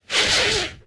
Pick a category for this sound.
Sound effects > Other